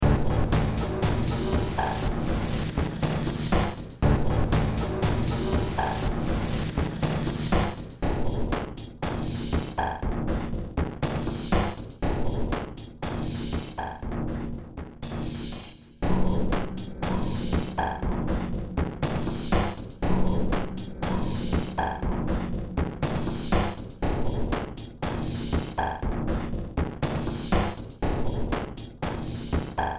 Music > Multiple instruments
Demo Track #3085 (Industraumatic)
Ambient, Cyberpunk, Games, Horror, Industrial, Noise, Sci-fi, Soundtrack, Underground